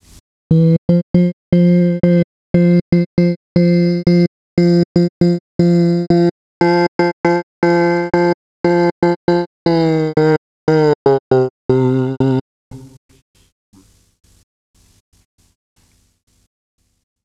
Speech > Processed / Synthetic
wrecked vox 16
A heavily processed vocal effect recorded using an SM Beta 57a microphone into Reaper, Processed with a myriad of vst effects including Shaperbox, Infiltrator, Fab Filter, etc
spooky, alien, abstract, wtf, shout, sounddesign, processed, strange, growl, vocals, weird, fx, vox, animal, atmosphere, howl, monster, glitch, pitch, sfx, effect, vocal, otherworldly, glitchy, sound-design, reverb, dark